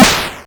Instrument samples > Percussion
made in furnace (emulating the x16 computer chip) and then post processed a little in openmpt